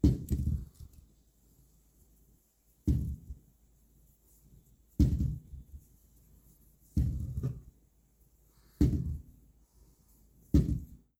Sound effects > Objects / House appliances
FOODIngr-Samsung Galaxy Smartphone, CU Potato, Drop Nicholas Judy TDC
A potato dropping.
drop, foley, Phone-recording, potato